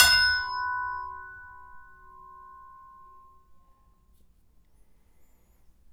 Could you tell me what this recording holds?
Sound effects > Other mechanisms, engines, machines
metal shop foley -029
shop, foley, knock, bop, strike, thud, tools, little, wood, metal, rustle, sound, crackle, percussion, sfx, oneshot, boom, bang, pop, bam, tink, fx, perc